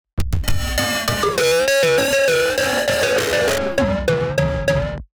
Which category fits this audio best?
Sound effects > Electronic / Design